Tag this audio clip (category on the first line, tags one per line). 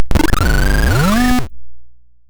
Sound effects > Electronic / Design
FX
Synth
Sweep
DIY